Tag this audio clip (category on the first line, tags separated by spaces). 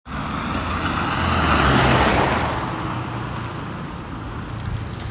Sound effects > Vehicles
car field-recording tampere